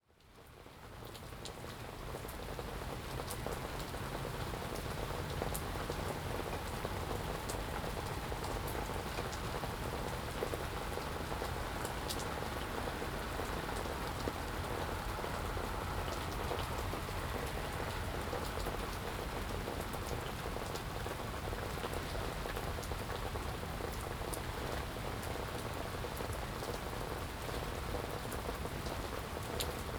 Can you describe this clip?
Soundscapes > Nature
This is the sound of a light rain storm on the Atlantic coast in Castine, Maine. This was recorded during the late evening in mid-July, around 22:00h. The rain is falling onto a wooden porch, and the sound of the water hitting the furniture cushions is predominant. In the background, the rain can be heard falling on other trees and structures in the backyard. Way in the distance, you can hear the slight sound of the ocean, which was relatively calm that night. The mics are the A-10’s onboard pair, in an XY configuration. This was recorded from an open window, about 4 meters above the porch. The weather was cool in the low 20s celsius, humid, still, and raining.